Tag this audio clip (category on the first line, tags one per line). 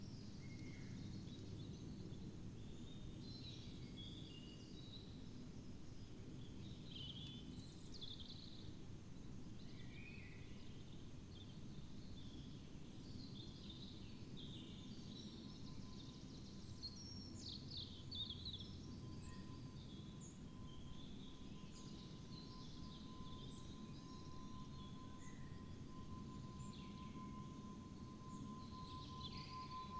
Soundscapes > Nature
data-to-sound
Dendrophone
modified-soundscape
raspberry-pi
soundscape